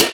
Instrument samples > Percussion
hi-hat beautiful 20-sabian-vault-artisan
A BEAUTIFUL HI-HAT! Remind me to use it!
Bosporus, brass, bronze, chick-cymbals, closed-cymbals, closed-hat, crisp, cymbal, cymbal-pedal, cymbals, drum, drums, facing-cymbals, hat, hat-set, hi-hat, Istanbul, Meinl, metal, metallic, microcymbal, minicymbal, Paiste, percussion, picocymbal, Sabian, snappy-hats, Zildjian